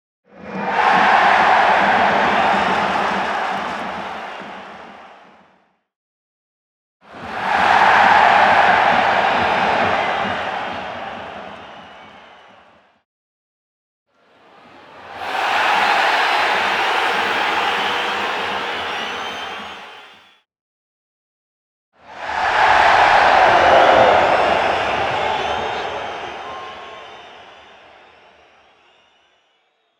Soundscapes > Other
CRWDReac-SOCCER Millerntor Stadium Crowd Reaction Excited 01 PHILIPP FEIT FCSP 29.546 Sound Of Sankt Pauli
Authentic live recording from FC St. Pauli’s Millerntor Stadium, capturing the collective excitement of 29,546 passionate fans. The crowd roars with energy as the atmosphere swells — voices rise, anticipation builds, and a wave of cheers and shouts fills the stands.